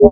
Instrument samples > Synths / Electronic
DISINTEGRATE 8 Ab
bass, fm-synthesis